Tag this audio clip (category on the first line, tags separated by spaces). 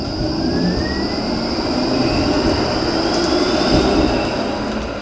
Sound effects > Vehicles
tram transportation vehicle